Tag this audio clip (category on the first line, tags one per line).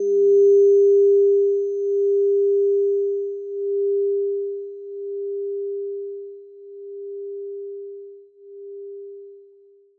Sound effects > Objects / House appliances
metal
pipe
tone